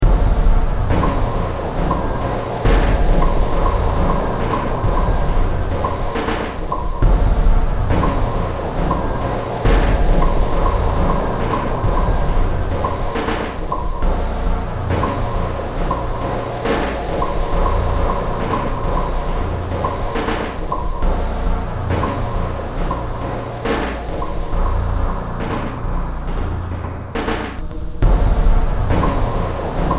Music > Multiple instruments
Demo Track #3856 (Industraumatic)
Soundtrack, Horror, Industrial, Underground, Games, Noise, Cyberpunk, Sci-fi